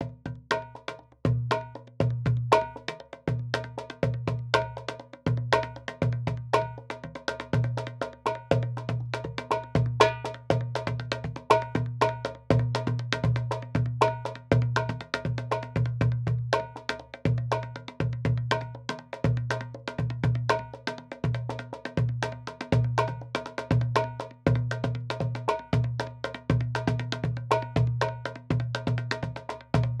Music > Solo percussion
Wavedrum Rhythm
A short rhythm played on a Wavedrum Global, factory preset 101 with reverb turned off. Recorded through Scarlett 8i6 with Air mode switched on. Roughly 120 bpm, not quantised.